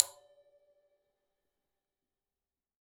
Music > Solo instrument
Rim Hit Perc Oneshot-007
Crash, Custom, Cymbal, Cymbals, Drum, Drums, FX, GONG, Hat, Kit, Metal, Oneshot, Paiste, Perc, Percussion, Ride, Sabian